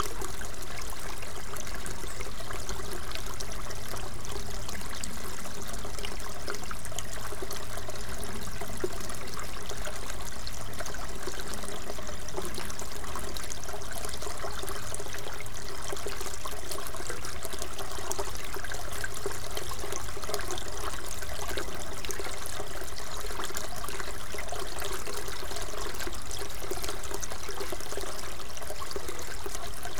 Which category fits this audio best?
Soundscapes > Other